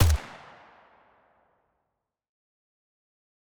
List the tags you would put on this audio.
Sound effects > Electronic / Design
designed fire gun one-shot riflle weapon

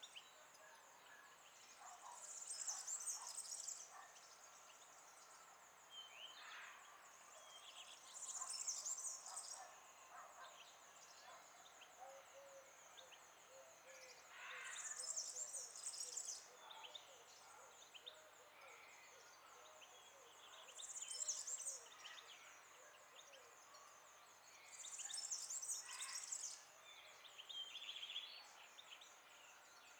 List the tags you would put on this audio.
Soundscapes > Nature
bird birds birdsong field-recording forest

